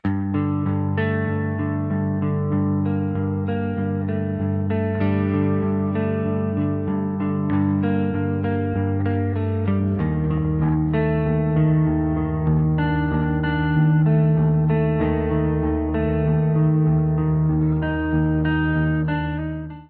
Music > Other
depressive BM electric guitar sample
BM, depressive, electric, guitar, sample